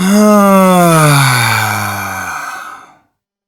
Sound effects > Human sounds and actions
Man- Sigh, weary exhalation 1
A man's weary sigh
human, voice, vocal, tired, sigh, male, exhalation